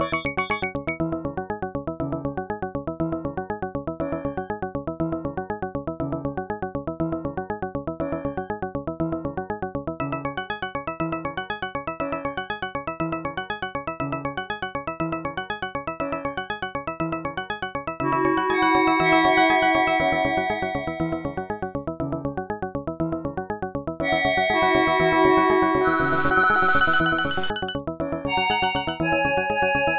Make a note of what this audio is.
Music > Multiple instruments
TGB 01 ÂmNhạc
Short background loop create use C language program (no instrument sample) in year 2025.08.14. Create use FM synthesis and add sin wave.